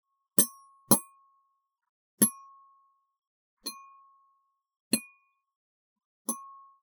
Sound effects > Objects / House appliances
Hitting a Bowl with a Fork.